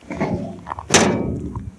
Sound effects > Objects / House appliances
Metal hatch closing. Recorded with my phone.
close, closing, hatch, metal, shut, slam